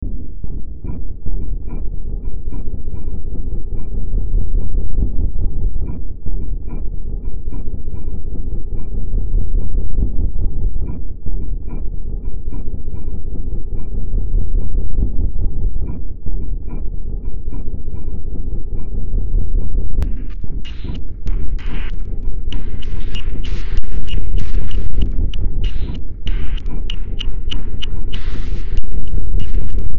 Music > Multiple instruments
Demo Track #3907 (Industraumatic)
Industrial, Cyberpunk, Ambient, Games, Noise, Soundtrack, Horror, Underground, Sci-fi